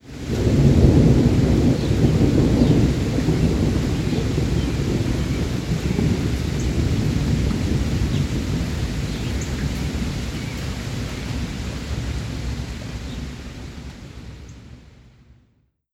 Sound effects > Natural elements and explosions
THUN-Samsung Galaxy Smartphone, CU Passing Thunder Nicholas Judy TDC
Passing thunder. Heavy rain and birdsong in background.
thunder, Phone-recording, rain, passing